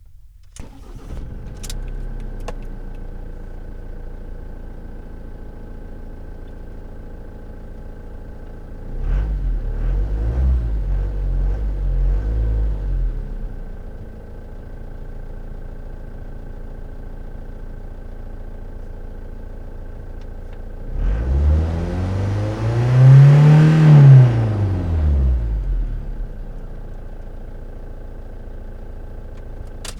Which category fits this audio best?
Sound effects > Vehicles